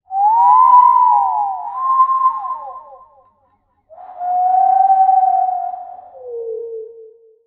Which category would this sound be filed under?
Sound effects > Human sounds and actions